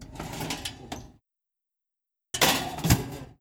Sound effects > Objects / House appliances
DOORAppl-Samsung Galaxy Smartphone, CU Breville Smart Oven Pro Door, Open, Close Nicholas Judy TDC
A Breville Smart Oven Pro door opening and closing. Recorded at Target.
open, oven, Phone-recording, smart-oven, foley, door, close